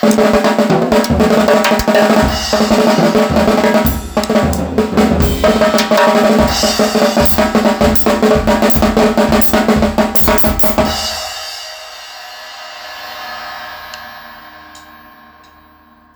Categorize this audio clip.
Instrument samples > Percussion